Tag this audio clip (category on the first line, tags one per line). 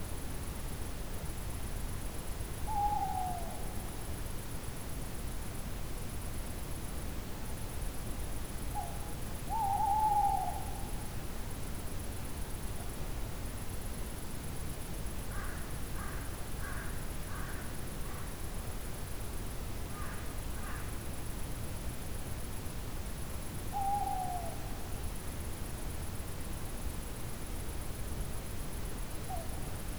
Soundscapes > Nature
Cote-dor
D104
forrest
late-evening